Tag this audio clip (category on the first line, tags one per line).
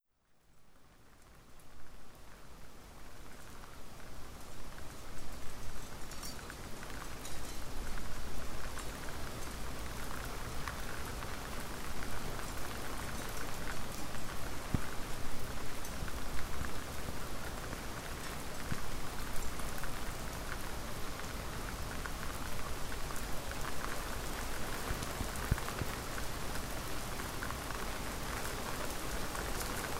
Soundscapes > Nature
drizzle
drizzling
drizzly
nature
Phone-recording
rain